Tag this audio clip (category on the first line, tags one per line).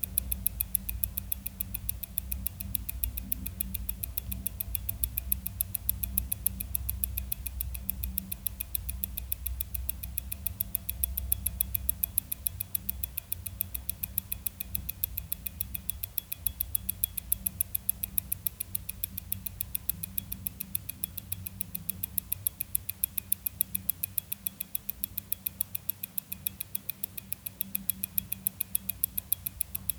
Sound effects > Objects / House appliances
wrist-watch
sfx
f6
lct440
time
lewitt
clock
watch
ticking
zoom